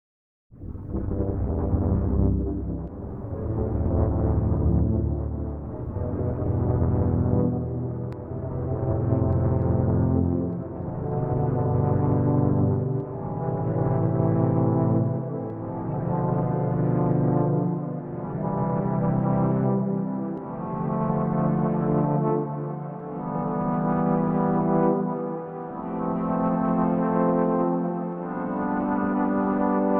Instrument samples > Synths / Electronic
EVAN P - PATCH IT UP - CHORD : PAD
pad w heavy effects
MUS-232, PATCHITUP, SOUND-DESIGN